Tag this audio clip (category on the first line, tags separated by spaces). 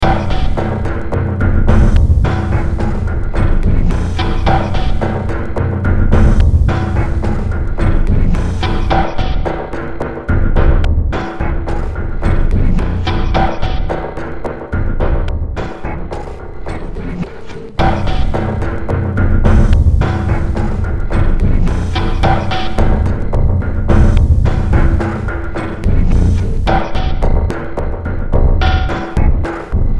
Music > Multiple instruments
Ambient Cyberpunk Noise Games Soundtrack Sci-fi Underground Horror Industrial